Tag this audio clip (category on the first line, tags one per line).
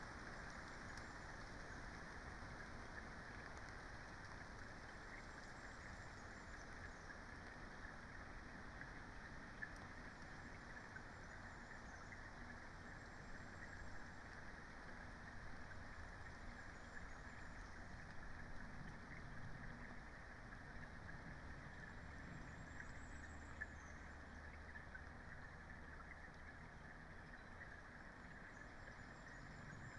Soundscapes > Nature
modified-soundscape
sound-installation
soundscape
data-to-sound
field-recording
natural-soundscape
raspberry-pi
nature
Dendrophone
artistic-intervention
weather-data
alice-holt-forest